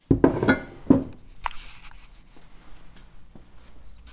Sound effects > Other mechanisms, engines, machines
Woodshop Foley-108
bang, perc, foley, thud, percussion, fx, metal, sound, knock, wood, tink, oneshot, crackle, sfx, bam, tools, pop, shop, boom, strike, bop, little, rustle